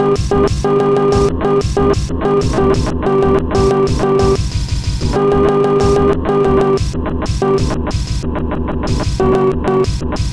Instrument samples > Percussion
This 186bpm Drum Loop is good for composing Industrial/Electronic/Ambient songs or using as soundtrack to a sci-fi/suspense/horror indie game or short film.
Ambient, Loopable, Industrial, Soundtrack, Drum, Samples, Loop, Weird, Packs, Underground, Dark